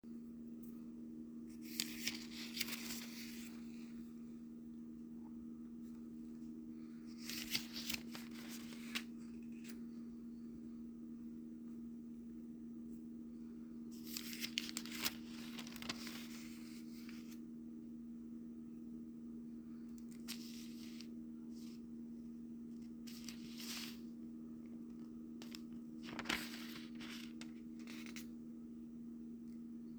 Sound effects > Objects / House appliances
Pages of bible sound
Recorded this in my own room because I couldn't find a satisfying sound of pages flipping.
flipping, pages, rustling